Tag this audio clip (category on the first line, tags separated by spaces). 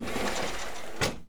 Sound effects > Objects / House appliances
recording sampling